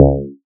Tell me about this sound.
Instrument samples > Synths / Electronic
DUCKPLUCK 2 Db
additive-synthesis, fm-synthesis